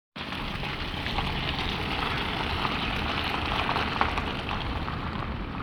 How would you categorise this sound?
Sound effects > Vehicles